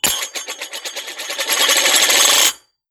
Sound effects > Objects / House appliances
METLImpt-Samsung Galaxy Smartphone, CU Tin Metal Lid, Drop, Spin Nicholas Judy TDC
A tin metal lid dropping and spinning.
drop, Phone-recording, spin, metal, tin